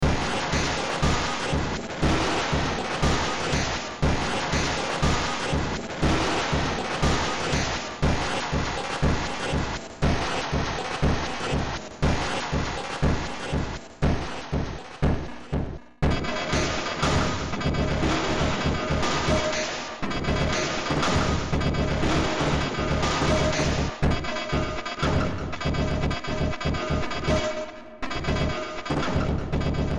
Music > Multiple instruments
Demo Track #3789 (Industraumatic)
Ambient, Cyberpunk, Horror, Industrial, Noise, Soundtrack, Underground